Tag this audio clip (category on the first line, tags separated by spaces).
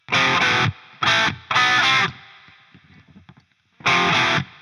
Music > Solo instrument
metal guitar